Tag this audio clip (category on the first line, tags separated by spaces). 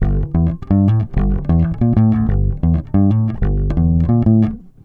Instrument samples > String
rock loop slide loops bass fx funk plucked mellow riffs pluck oneshots blues charvel electric